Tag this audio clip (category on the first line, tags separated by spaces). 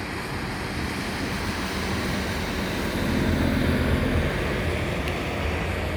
Sound effects > Vehicles
bus engine